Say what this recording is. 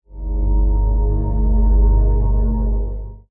Sound effects > Experimental
A very low-end, bassy sound effect, made on a Korg Wavestate. Great for layering underneath other sounds, to add some dramatic eambience
ambient, sinister, creepy, bassy, stinger, sfx, spooky, drone, sub, dark, sound-effect, effect, horror, illbient, scary, soundscape